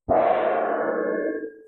Sound effects > Objects / House appliances
big drum beat
Like a japanese drum. Recorded with phone mobile device NEXG N25